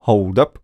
Speech > Solo speech
Hold up
chant, dry, FR-AV2, hold, hype, Male, Man, Mid-20s, Neumann, oneshot, raw, singletake, Single-take, Tascam, U67, un-edited, up, Vocal, voice